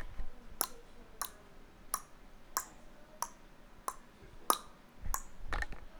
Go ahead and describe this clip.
Sound effects > Human sounds and actions
Clicking with Tongue
Click, Human, Snap